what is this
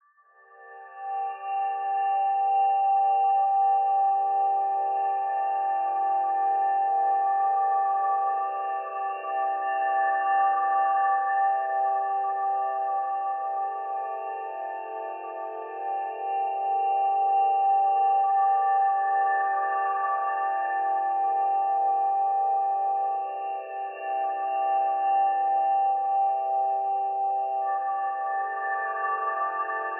Soundscapes > Synthetic / Artificial
Soft Screeching Pad
A soft resolute pad, whose chords come from the plugins of DSK Overture and Sitar Renaissance; heavy modulation was experimented upon (regarding the sinusoid/phase frequencies, as well as convolver) to give the sound a full and textural effect.